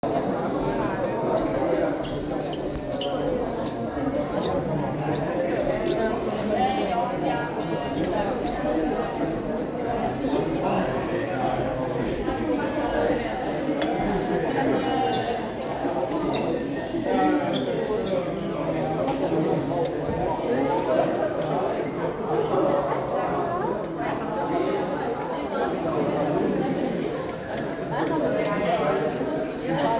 Soundscapes > Urban
Cinema full of teenagers
Just a buncha yapping in a big room
yapping, talking, ambience, loud, voices, room, people, theater, yap, cinema